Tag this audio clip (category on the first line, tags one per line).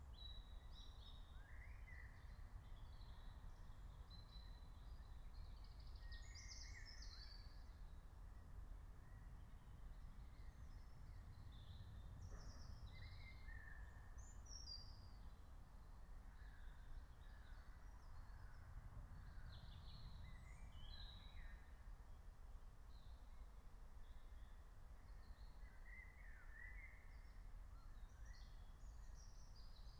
Soundscapes > Nature
natural-soundscape
soundscape
nature
raspberry-pi
meadow
alice-holt-forest
field-recording
phenological-recording